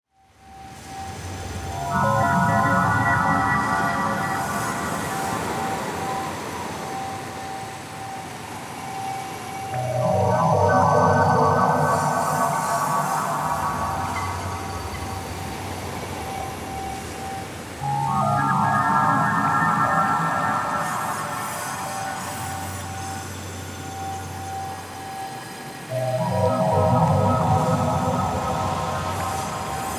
Sound effects > Experimental
MAGShim Ethereal Crystal Ball Ambience
Airwiggles Noisevember 2025 day 7 prompt - Crystal Ball 🔮✨ resampled the resonance of a wine glass with serum 2's granular oscillator layered with some additional sfx/ambience.
ball, crystal, ethereal, magic, spell, UCS, witch